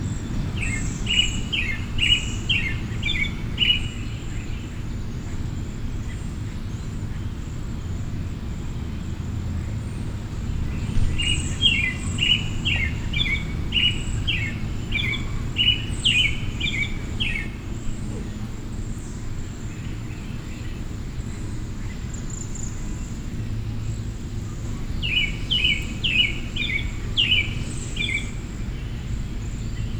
Soundscapes > Nature
Late afternoon neighborhood F4 LomUsiPro Mix MAY 13 2025 v2
As the sun begins to set in a small, quiet neighborhood in a small, quiet town in Illinois, an American Robin sings out from his high perch. Over the droning of several air conditioners, his song rings loud and clear. He is so glad warm weather is back, and he is looking forward to raising another family. Recorded on Tuesday May 13 2025 using the following gear: Recorder: Zoom F4 Microphone: One, LOM UsiPro omnidirectional microphone.
Robin, Neighborhood, Turdus-migratorius, Spring, Park, Soundscape, Field-Recording, Bird, Birds